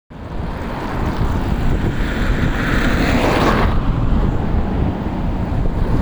Sound effects > Vehicles
Outdoor recording of a passing car on Malminkaari Road in Helsinki. Captured with a OnePlus 8 Pro using the built‑in microphone.